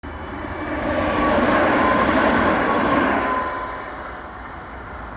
Soundscapes > Urban
The sound of a passing tram recorded on a phone in Tampere